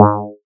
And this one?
Instrument samples > Synths / Electronic
DUCKPLUCK 2 Ab
fm-synthesis, additive-synthesis, bass